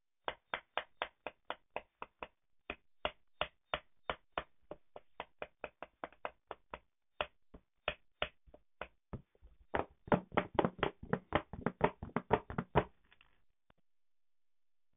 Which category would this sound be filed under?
Instrument samples > Percussion